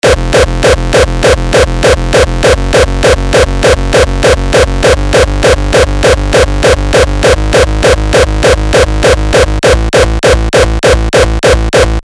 Music > Solo instrument
Frechcore kick test 1-F-#F-G-#F-A 100 bpm
Bass was synthed with Phaseplant only.
Kick, Hardcore, Loop, Frechcore